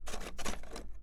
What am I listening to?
Sound effects > Objects / House appliances
ice quiet movement in tray1
Recorded with rode nt1
cubes, tray, ice